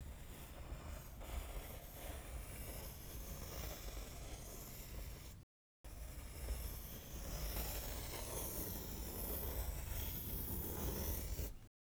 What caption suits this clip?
Sound effects > Objects / House appliances
Pencil stroke slow soft
Pencil scribbles/draws/writes/strokes slowly and softly.
draw, pencil, write